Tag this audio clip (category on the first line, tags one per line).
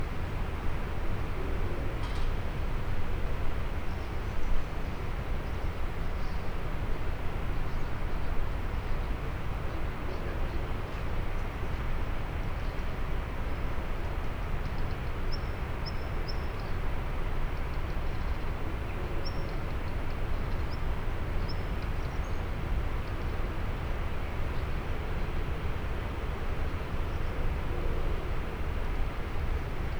Soundscapes > Urban
2025
81000
Albi
Binaural
birds
City
field-recording
France
FR-AV2
Friday
garden
grey-sky
in-ear
in-ear-microphones
July
Morning
Occitanie
OKM1
OKM-I
Soundman
Tarn
Tascam